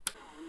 Sound effects > Objects / House appliances
310325 0910 ermesinde somdeclickradio tascamdr-40x 01
Monday 31st March Around 9:10 in the morning In Ermesinde Radio click turning on (fx-o) Tasca dr-40x recorder Recorded indoors, close to the sound source 310325_0910_ermesinde_somdeclickradio_tascamdr-40x_01